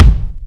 Instrument samples > Percussion
kick bubinga 1
attack, bass, bass-drum, bassdrum, beat, bubinga, death-metal, drum, drums, fat-drum, fatdrum, fat-kick, fatkick, forcekick, groovy, headsound, headwave, hit, kick, mainkick, metal, Pearl, percussion, percussive, pop, rhythm, rock, thrash, thrash-metal, trigger